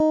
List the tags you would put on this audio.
Instrument samples > String
arpeggio
guitar
sound
stratocaster

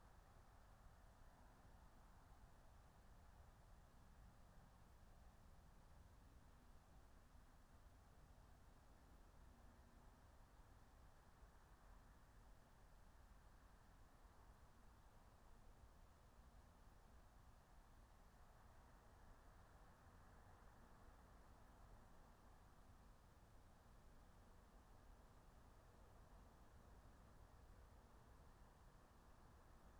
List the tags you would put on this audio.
Soundscapes > Nature
soundscape
raspberry-pi
natural-soundscape
alice-holt-forest
nature

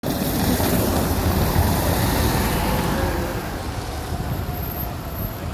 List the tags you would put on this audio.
Soundscapes > Urban
transportation
field-recording
bus
public

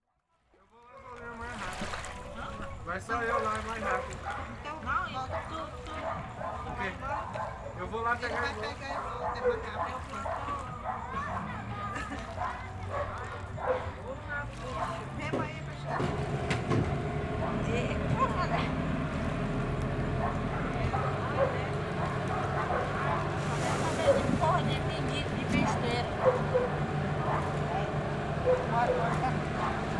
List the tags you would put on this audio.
Soundscapes > Urban
brazil
soundscape
field
children
sonoteca-uirapuru

